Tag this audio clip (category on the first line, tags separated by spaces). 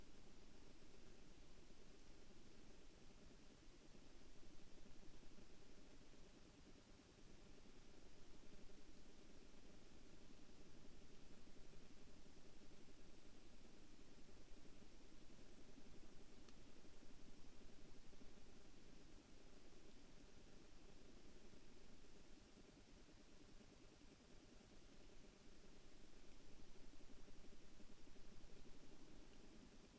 Soundscapes > Nature
soundscape modified-soundscape raspberry-pi data-to-sound sound-installation field-recording alice-holt-forest nature weather-data natural-soundscape Dendrophone phenological-recording artistic-intervention